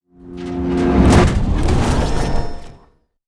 Sound effects > Other mechanisms, engines, machines
Sound Design Elements-Robot mechanism-018
clanking, operation, circuitry, synthetic, movement, whirring, sound, feedback, actuators, servos, automation, design, metallic, mechanical, robotic, processing, mechanism, machine, robot, gears, clicking, grinding, motors, hydraulics, elements, powerenergy, digital